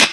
Instrument samples > Percussion
hi-hatized crash Sabian low-pitched 1 puny
It's a bass hi-hat based on a re-enveloped crash file. closed-hi-hatized namesake crash (search my crash folder) I drew the waveform's envelope on WaveLab 11. tags: hi-hat minicymbal picocymbal click metal metallic tick bronze brass cymbal-pedal drum drums percussion hat hat-cymbal closed-hat closed-cymbals chick-cymbals hat-set snappy-hats facing-cymbals dark crisp dark-crisp Zildjian Sabian Meinl Paiste Istanbul Bosporus
hat, picocymbal, metallic, facing-cymbals, closed-hat, percussion, click, drum, dark, Istanbul, hi-hat, Zildjian, metal, Meinl, drums, hat-set, tick, Bosporus, minicymbal, snappy-hats, cymbal-pedal, brass, dark-crisp, hat-cymbal, chick-cymbals, crisp, Sabian, closed-cymbals, Paiste, bronze